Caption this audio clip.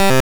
Sound effects > Electronic / Design
another retro sound idk mate
made in hUGETracker. a gameboy tracker
buzzer, gb, hUGETracker